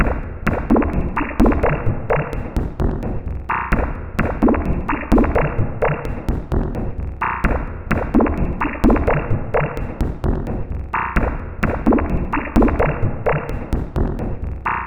Instrument samples > Percussion
This 129bpm Drum Loop is good for composing Industrial/Electronic/Ambient songs or using as soundtrack to a sci-fi/suspense/horror indie game or short film.
Weird, Underground, Loopable, Drum, Alien, Industrial, Samples, Dark, Packs, Loop, Soundtrack, Ambient